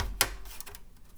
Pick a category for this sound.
Sound effects > Other mechanisms, engines, machines